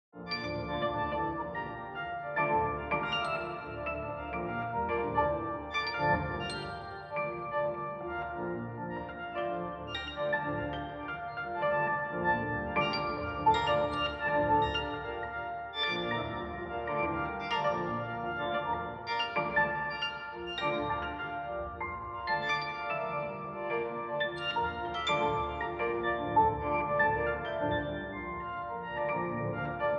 Music > Multiple instruments
Botanica-Granular Piano Ambient 4
Processed with Khs Convovler, Khs 3-band EQ, Khs Slice EQ, Khs Multipass, ZL EQ, Fruty Limiter.
FX, Orchestral